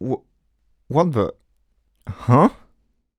Speech > Solo speech
Surprised - W what the huh
voice, Neumann, U67, dialogue, Mid-20s, Human, Vocal, Single-take, Man, Tascam, Male, Voice-acting, Video-game, confused, talk, NPC, surprised, oneshot, singletake, FR-AV2